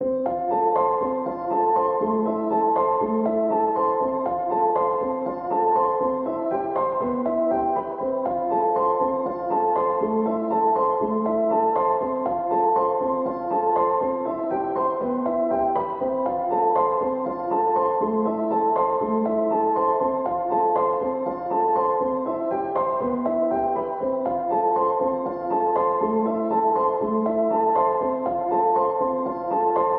Music > Solo instrument
Piano loops 167 efect 4 octave long loop 120 bpm
120, 120bpm, free, loop, music, piano, pianomusic, reverb, samples, simple, simplesamples